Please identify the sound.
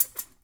Sound effects > Other mechanisms, engines, machines
metal shop foley -177
wood, tools, tink, metal, percussion, sfx, strike, foley, shop, boom, fx, oneshot, sound, knock, bop, bang, perc, bam, crackle, thud, rustle, little, pop